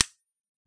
Sound effects > Other mechanisms, engines, machines
Circuit breaker switch-004
It's a circuit breaker's switch, as simple as that. Follow my social media please, I'm begging..
recording, sampling, foley, percusive, click